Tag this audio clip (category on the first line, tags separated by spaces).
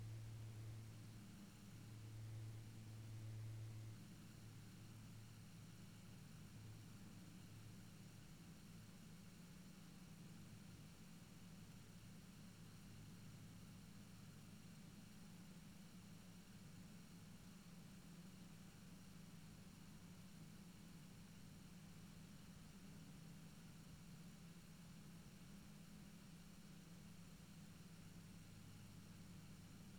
Soundscapes > Nature
meadow nature natural-soundscape